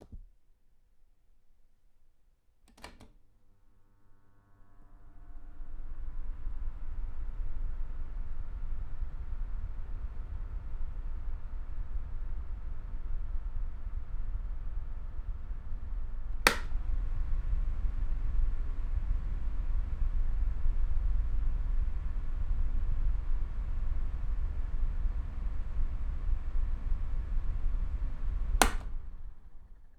Sound effects > Objects / House appliances
ZOOM WSU-1 vs Bubblebee Windkiller windtest with fan
I am using the ZOOM H5 recorder, first with the ZOOM WSU-1 windjammer, and secondly with the Bubblebee Windkiller. The fan blows directly to the head of the microphone, which lies on a box. I first apply the low setting on the fan, followed by the high setting.
Windkiller, ZOOM